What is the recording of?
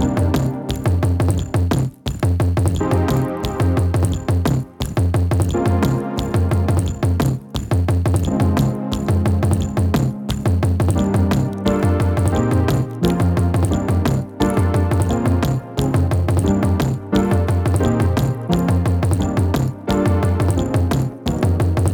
Soundscapes > Synthetic / Artificial
Cigs & Sex
cool funky jingle I'm working on in Ableton Live